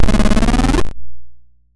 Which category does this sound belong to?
Sound effects > Electronic / Design